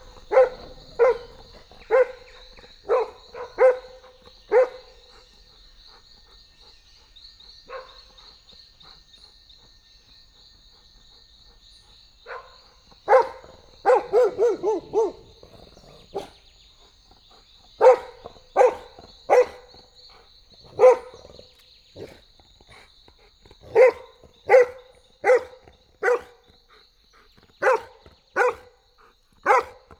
Sound effects > Animals
A random street dog barking at me.
barking, pet, growl, dog, outside, bark, animal